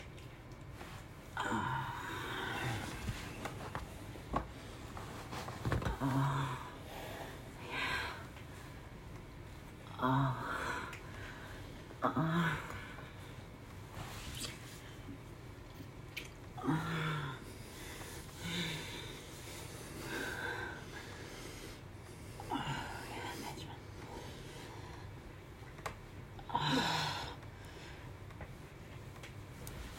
Sound effects > Human sounds and actions
In a quiet room, the sounds of intimacy carry a rhythm all their own—soft gasps layered with the gentle rustle of sheets, the creak of a bed frame, and the muted, fleshy cadence of bodies meeting. Each movement creates a subtle, steady sound: a low thud, skin against skin, building with intensity and easing again with breath. It’s not loud, but unmistakable—like the hush of a drumbeat slowed by closeness and intent, echoing in the stillness between us.